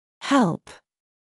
Speech > Solo speech
to help
voice, english, word, pronunciation